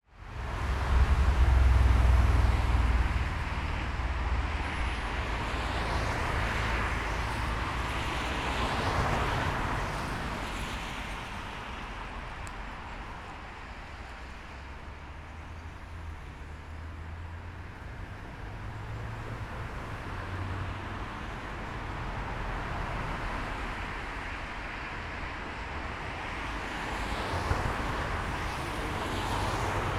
Soundscapes > Other
A morning recording of vehicles passing by on the A51, Staffordshire. Zoom F3. Stereo. EM272Z1 Mics.
cars, traffic, road, roadside, passing-by, vehicles